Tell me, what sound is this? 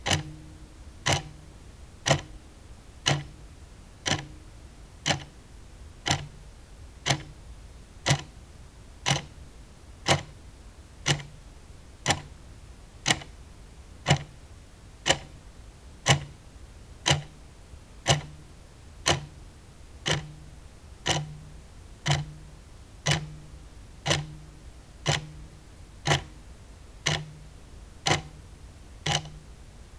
Sound effects > Objects / House appliances
Date YMD : 2025 July 12 Location : Albi 81000 Tarn Occitanie France. Sennheiser MKE600 P48, no filter. Weather : Processing : Trimmed in Audacity.